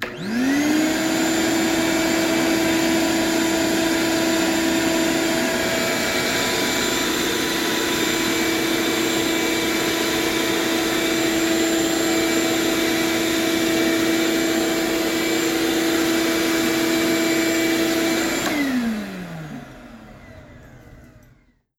Sound effects > Other mechanisms, engines, machines
MACHMisc-Samsung Galaxy Smartphone, CU Shopvac, Turn On, Run, Off Nicholas Judy TDC
A shopvac turning on, running and turning off.